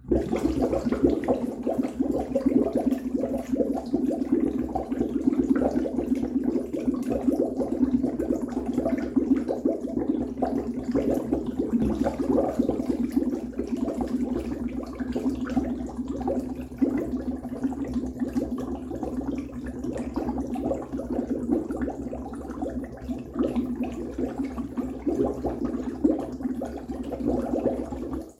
Sound effects > Natural elements and explosions
WATRBubl-Samsung Galaxy Smartphone Bubbling, Large Nicholas Judy TDC
Phone-recording, bubbles, large, water